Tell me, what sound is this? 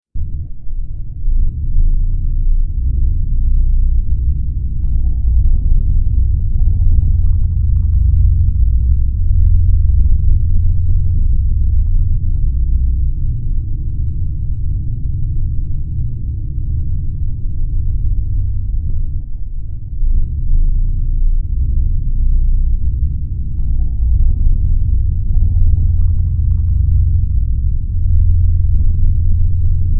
Soundscapes > Synthetic / Artificial
Looppelganger #163 | Dark Ambient Sound
Weird, Soundtrack, Ambient, Darkness, Horror, Underground, Silent, Gothic, Survival, Noise, Games, Sci-fi, Drone, Ambience, Hill